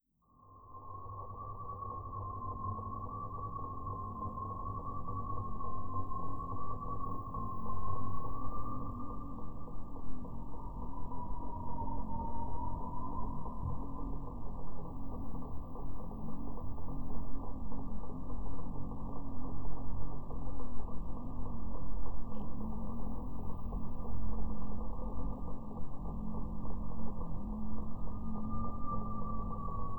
Soundscapes > Other
Random Generic Sci-Fi Ambience
Randomly decided to mess with Audacity, resulting in this. Do with it what you will.